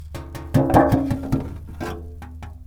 Sound effects > Other mechanisms, engines, machines
Woodshop Foley-088
bam, bang, boom, bop, crackle, foley, fx, knock, little, metal, oneshot, perc, percussion, pop, rustle, sfx, shop, sound, strike, thud, tink, tools, wood